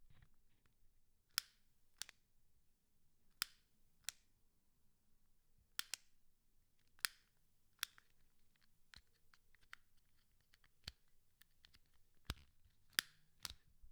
Sound effects > Other mechanisms, engines, machines
Lighter button being clicked
One of them barbecue lighters